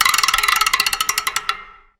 Sound effects > Objects / House appliances
Metallic Rattle - Stick on Iron Bar Fence 2
A wooden stick striking an Iron bar fence